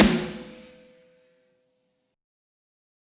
Instrument samples > Percussion
A Hard Day's Snare
One shot sample of a 6.5x14" Pork Pie Maple Snare Drum!